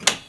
Sound effects > Objects / House appliances
door sauna open2
Sauna door being opened. Recorded with my phone.
door, open